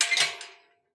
Sound effects > Other
A recording of a Metal gate being pulled. Edited in RX 11.
creaking, gate, high, metal, outdoor, pitched